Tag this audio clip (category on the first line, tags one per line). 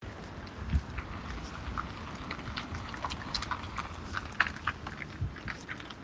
Sound effects > Vehicles
idle-speed
studded-tyres
asphalt
car
winter